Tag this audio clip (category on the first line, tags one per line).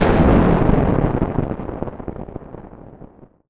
Sound effects > Electronic / Design
overload
retro
fx
glitchy
bit-crushing
sfx
datamosh
oldschool
corrupt
16bit